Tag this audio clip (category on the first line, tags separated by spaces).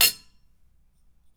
Sound effects > Objects / House appliances
drill fx mechanical perc metal foundobject natural clunk industrial fieldrecording foley glass sfx stab object percussion oneshot bonk hit